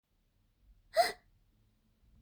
Speech > Solo speech
Effort, voice tiny, gentle